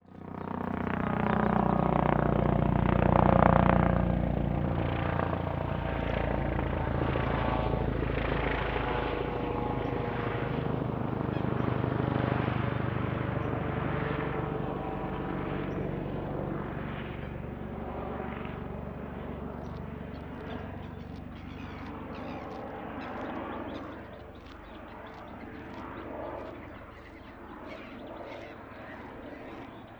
Soundscapes > Other
A recording of an Helicopter circling above when I was at an RSPB site.